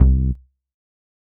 Instrument samples > Synths / Electronic
syntbas0022 C-kr
VSTi Elektrostudio (Model Mini) + 2xSynth1
bass, synth, vst, vsti